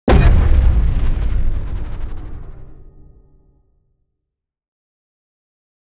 Sound effects > Other
Sound Design Elements Impact SFX PS 093
force, hard